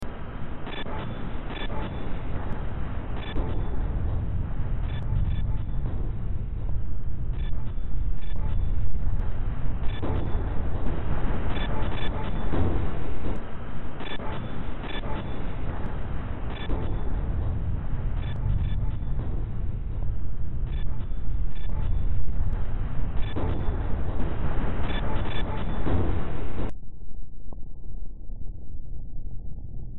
Music > Multiple instruments
Demo Track #3899 (Industraumatic)
Ambient, Soundtrack, Underground, Sci-fi, Noise, Industrial, Games, Cyberpunk, Horror